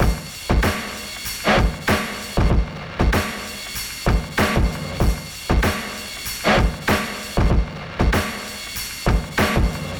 Music > Solo percussion
bb drum break loop bust 96
DrumLoop, Drum, Break, Dusty, 96BPM, Breakbeat, Lo-Fi, Acoustic, Drum-Set, Drums, Vinyl, Vintage